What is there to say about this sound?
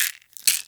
Sound effects > Objects / House appliances
Pill Bottle Shake 3
Pill bottle sounds
opening, pill, closing, open, close, drugs, plastic, shaking, pills, meds, shake, bottle